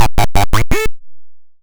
Sound effects > Electronic / Design
Optical Theremin 6 Osc dry-076
Robotic, Glitch, Instrument, Trippy, Bass, Electronic, Alien, Dub, Sci-fi, Robot, Handmadeelectronic, Glitchy, Theremin, Otherworldly, Theremins, Analog, SFX, Synth, Noise, FX, Optical, Spacey, noisey, Electro, Sweep, Scifi, Infiltrator, Digital, Experimental, DIY